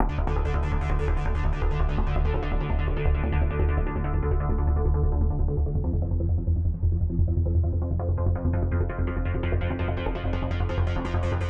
Instrument samples > Synths / Electronic
modulated pulse bass
Modulated bassline in D